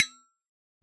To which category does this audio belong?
Sound effects > Objects / House appliances